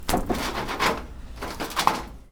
Sound effects > Objects / House appliances
Junkyard Foley and FX Percs (Metal, Clanks, Scrapes, Bangs, Scrap, and Machines) 45
Clang, Machine, Robotic, Junkyard, Environment, Robot, Smash, Ambience, Atmosphere, dumpster, tube, Bang, garbage, rubbish, SFX, trash, waste, Percussion, Dump, Bash, scrape, FX, Junk, Foley, Clank, rattle, Perc, Metal, dumping, Metallic